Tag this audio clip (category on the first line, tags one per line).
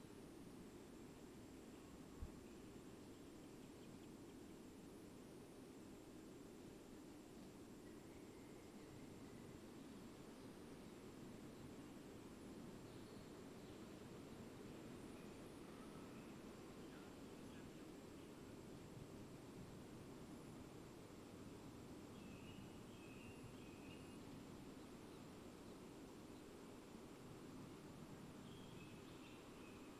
Soundscapes > Nature
phenological-recording
Dendrophone
nature
modified-soundscape
soundscape
field-recording
data-to-sound
raspberry-pi
weather-data
alice-holt-forest
artistic-intervention
natural-soundscape
sound-installation